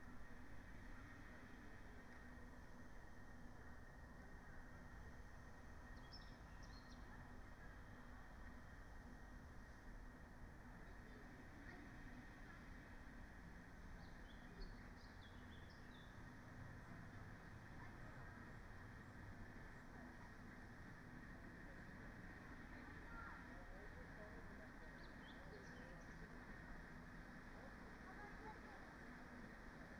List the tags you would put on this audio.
Soundscapes > Nature
alice-holt-forest
phenological-recording
weather-data

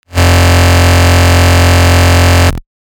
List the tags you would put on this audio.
Instrument samples > Synths / Electronic
Distorted
Hard
Hardcore
Hardstyle

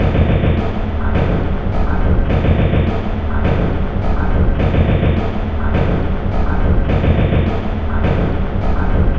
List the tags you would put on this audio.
Instrument samples > Percussion
Loop
Industrial
Loopable
Soundtrack
Samples
Dark
Alien
Drum
Ambient
Weird
Underground
Packs

